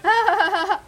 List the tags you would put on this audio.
Speech > Other
woman funny female laugh

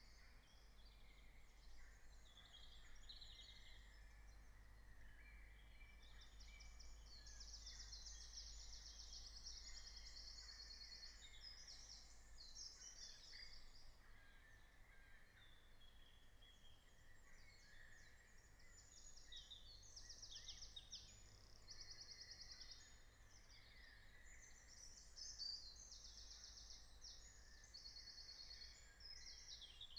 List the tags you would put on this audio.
Soundscapes > Nature
field-recording alice-holt-forest nature natural-soundscape soundscape raspberry-pi meadow phenological-recording

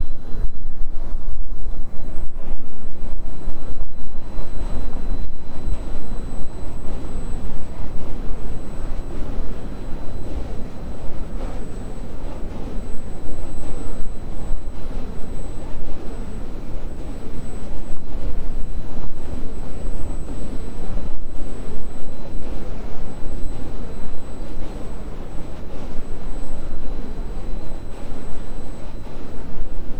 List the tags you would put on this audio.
Soundscapes > Urban
field-recording mill nature rotating turbine wind windmill

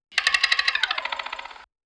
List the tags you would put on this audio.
Music > Other
guitar perepared

